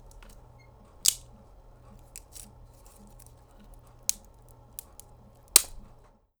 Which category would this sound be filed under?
Sound effects > Objects / House appliances